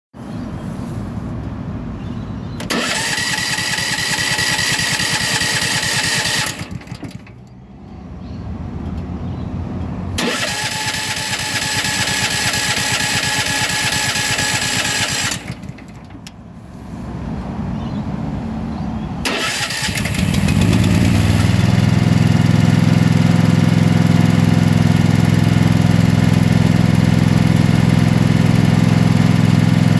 Soundscapes > Urban
Field Recording of a small Honda Engine trying to start.
Engine, Engine-starting, Field-recording, Small-engine